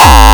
Instrument samples > Percussion
Gabbar Kick 6
Retouched multiple kicks in FLstudio original sample pack. Processed with ZL EQ, Waveshaper.
hardcore, oldschool